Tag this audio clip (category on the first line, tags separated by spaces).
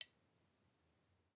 Sound effects > Other mechanisms, engines, machines
sample click